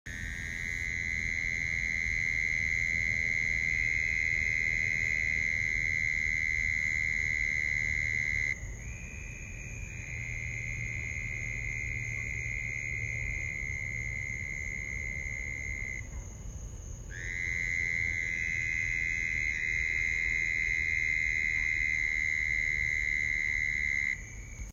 Sound effects > Animals
Iphone recording of little croaking frogs at night in Hawaii. They make a wall of sound. Recorded by me with no filters, processing etc.